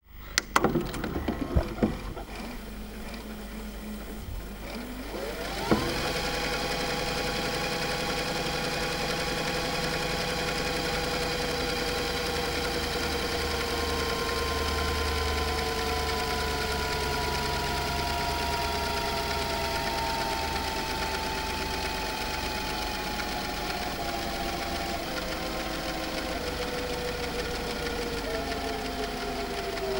Sound effects > Electronic / Design
VHS rewinding in a Samsung DVD_V6800